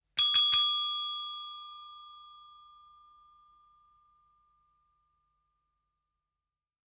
Sound effects > Objects / House appliances
Ring the receptionist's bell three times
Calling hotel staff by bell. Recorded in a recording studio. Please tell us how you plan to use this recording.
hall, tourism, Bell, motel, service, counter, hotel